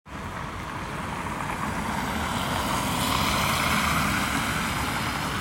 Sound effects > Vehicles
A car passing by from distance near insinnöörinkatu 60 road, Hervanta aera. Recorded in November's afternoon with iphone 15 pro max. Road is wet.
tampere, vehicle, rain